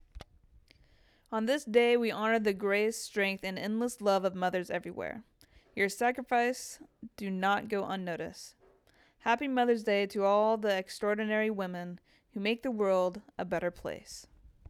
Speech > Solo speech
Formal Mother's Day Tribute – Elegant and Poetic
A formal and poetic Mother's Day voice-over, ideal for elegant tributes, professional projects, or heartfelt presentations. Script: "On this day, we honor the grace, strength, and endless love of mothers everywhere. Your sacrifices do not go unnoticed. Happy Mother’s Day to all the extraordinary women who make the world a better place."
CelebrateMoms, ElegantMessage, MothersDay, Poetic, TributeToMoms, VoiceOver